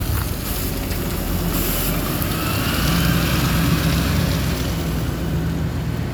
Soundscapes > Urban
Bus leaving bus stop (1)
Bus leaving the bus stop. Rusty sound of gravel on the road, background street noise, revving engine. Recorded with Samsung galaxy A33 voice recorder. Recorded on afternoon winter in Tampere, Finland.